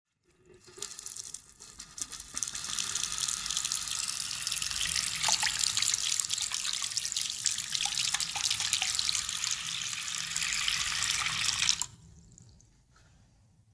Sound effects > Objects / House appliances
Running tap 01
I recorded a running tap. I placed my hand under the stream.
bath, bathroom, drain, drip, faucet, running, sink, splosh, tap, tub, water